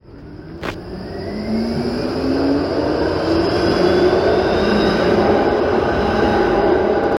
Soundscapes > Urban
Tram passing Recording 12
Trains, Rail, Tram